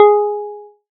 Instrument samples > Synths / Electronic
APLUCK 1 Ab
Synthesized pluck sound
additive-synthesis, pluck